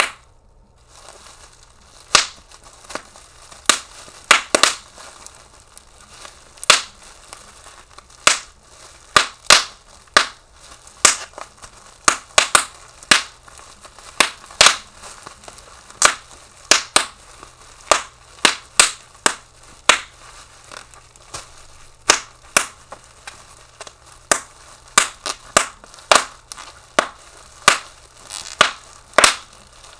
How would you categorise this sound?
Sound effects > Objects / House appliances